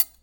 Sound effects > Objects / House appliances
Metal Tink Oneshots Knife Utensil 14
ding, Beam, Foley, Vibrate, Trippy, Wobble, Clang, Perc, ting, FX, metallic, SFX, Klang, Metal, Vibration